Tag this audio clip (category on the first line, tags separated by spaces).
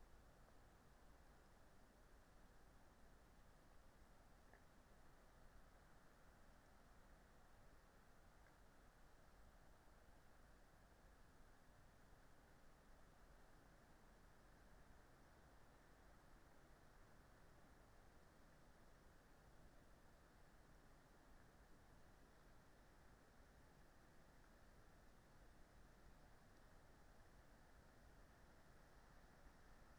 Soundscapes > Nature
modified-soundscape alice-holt-forest raspberry-pi Dendrophone phenological-recording weather-data field-recording sound-installation data-to-sound soundscape nature natural-soundscape artistic-intervention